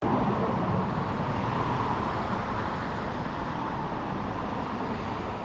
Sound effects > Vehicles
cars passing4
Multiple cars driving on a busy wet asphalt road, 10 to 20 meters away. Recorded in an urban setting in a near-zero temperature, using the default device microphone of a Samsung Galaxy S20+.
car, cars, road, street, traffic